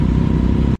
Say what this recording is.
Sound effects > Other mechanisms, engines, machines
clip prätkä (2)
Supersport, Motorcycle